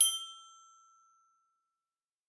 Sound effects > Natural elements and explosions
Metal Pop-up - 01
Recorded on 12.01.2026